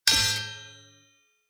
Sound effects > Objects / House appliances
Two Handed Sword Hit
A sword Hit made with a spoon and a fork recorded with Xiaomi Poco X3 mobile Phone and processed through Studio one via various plugins